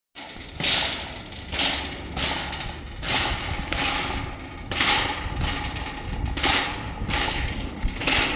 Sound effects > Other
A metal shopping cart rolled down the sidewalk recorded on my phone microphone the OnePlus 12R